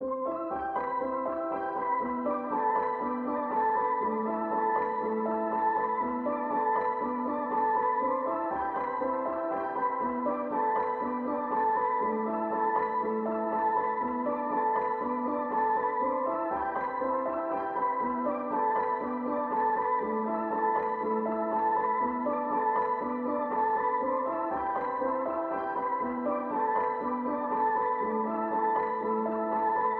Music > Solo instrument
Piano loops 192 efect 2 octave long loop 120 bpm
samples, reverb, simplesamples, pianomusic, music, loop, simple, 120bpm, free, 120, piano